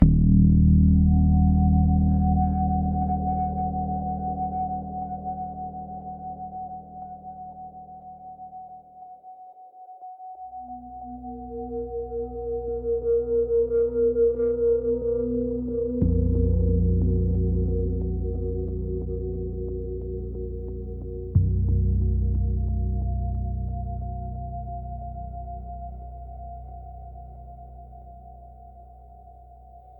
Music > Multiple instruments
Atonal Ambient Texture #002 Giorgia
This is part of some experiments I am running to create atonal atmospheric soundscape using AI AI Software: Suno Prompt: atonal, atmosferic, background, texture, pad synths, sad mood, minor scale
texture, experimental, pad, soundscape, ambient, ai-generated, atonal